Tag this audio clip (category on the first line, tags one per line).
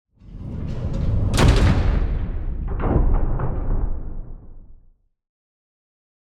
Sound effects > Other
bunker
clang
closing
door
echoing
fortress
gate
heavy
industrial
large
metal
resonant
scraping
sealing
secure
slam
thud
vault